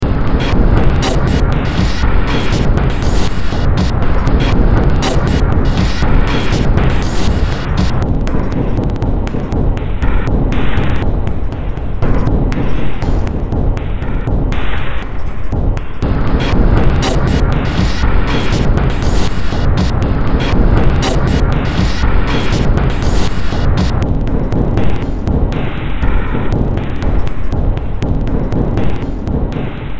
Music > Multiple instruments
Demo Track #3663 (Industraumatic)
Ambient, Cyberpunk, Games, Horror, Industrial, Noise, Sci-fi, Soundtrack, Underground